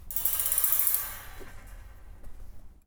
Sound effects > Objects / House appliances
Junkyard Foley and FX Percs (Metal, Clanks, Scrapes, Bangs, Scrap, and Machines) 63
Metallic, Atmosphere, Junk, rattle, Robot, Robotic, dumpster, Ambience, Clang, Clank, waste, SFX, Perc, rubbish, dumping, Smash, Machine, FX, Percussion, Foley, Dump, Bash, Junkyard, Environment, scrape, garbage, Bang, trash, tube, Metal